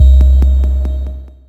Instrument samples > Synths / Electronic
CVLT BASS 144
wavetable, synth, subbass, stabs, sub, drops, subs, clear, bass, lfo, bassdrop, wobble, lowend, subwoofer, low, synthbass